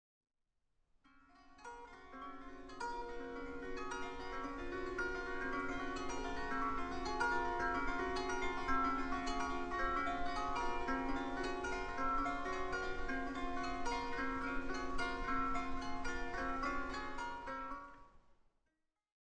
Music > Solo instrument
discordant dancing strings, open air 002
Lower pitched discordant guitar sounds dancing around each other. Recorded with a Zoom H5 and edited in FL Studio, just added some reverb.
ascending, cinematic, discordant, guitar, reverb, strings